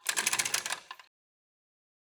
Sound effects > Other mechanisms, engines, machines
Pull Chain-03
machinery, gears, mechanical